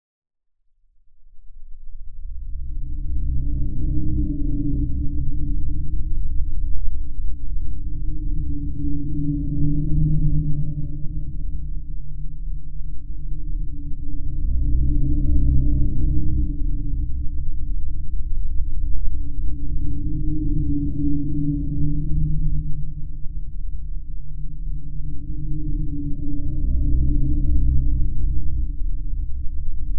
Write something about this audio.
Music > Other
Drain Music
Horror/Thrill. Created in Ableton. Repeatable Sound Track (This Audio was created by me)
Alien, Creepy, Sci-Fi, Spooky, Environment, Amb, Space, Horror, Music, Scary, Fantasy, Strange, Eerie